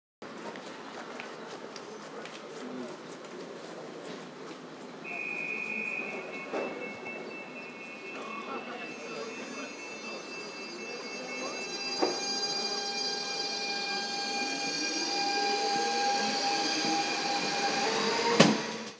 Soundscapes > Urban
Busy train station ambience 02
Stereo recording of a crowded train station with people talking, footsteps, announcements, and passing trains. Constant background activity.